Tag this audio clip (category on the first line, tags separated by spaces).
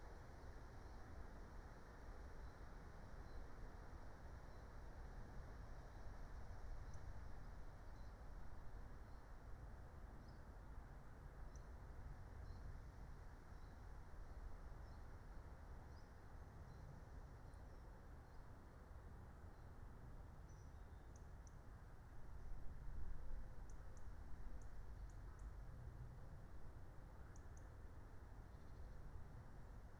Soundscapes > Nature
alice-holt-forest meadow natural-soundscape phenological-recording raspberry-pi